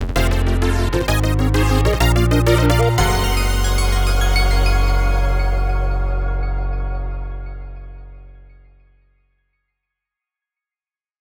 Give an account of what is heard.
Music > Multiple instruments
This mix has no 8-bit distortion and a short tail.